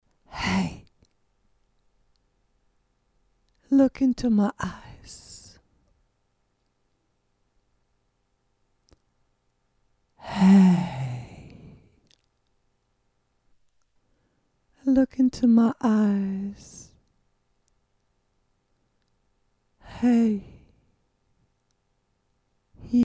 Speech > Conversation / Crowd
Heey, lookin' to my eyes
english, talk, meditation, speak, female, voice, vocal